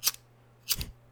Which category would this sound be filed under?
Sound effects > Other